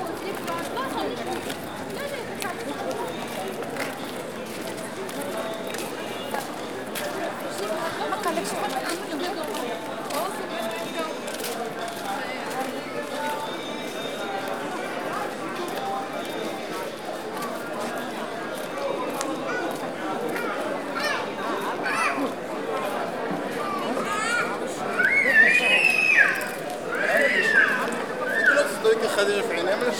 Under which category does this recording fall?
Soundscapes > Urban